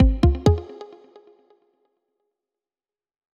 Sound effects > Other
A sound of achievement (i`m a noob at creating sounds), but i hope this sound will help you, while creating games :^)
SFXforgames, Achievement, SFX